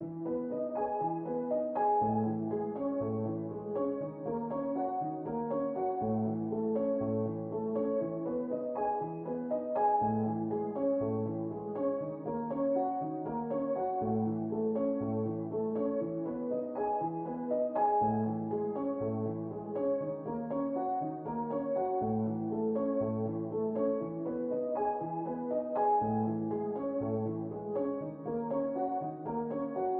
Music > Solo instrument
Piano loops 191 efect octave long loop 120 bpm
simple, free